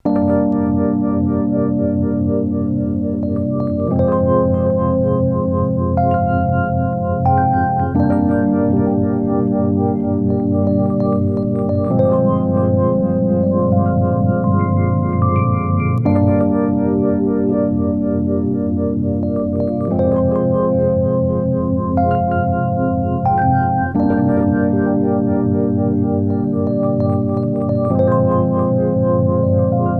Music > Solo instrument
Granular Piano Loop with Reverberations
A granular piano loop made out of a simple piano sequence Done with Torso S4
ambient, delay, echo, grand-piano, granular, loop, piano, reverb, torso, torso-s4